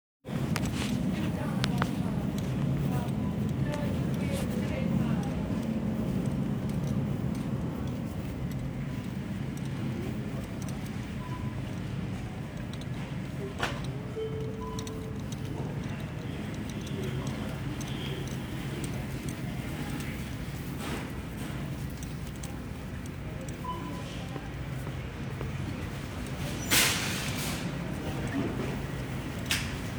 Soundscapes > Indoors
Walmart self check out
Walking through an aisle, going to self checkout and scanning an item. I didn't complete the transaction. Recorded on my Google pixel 9.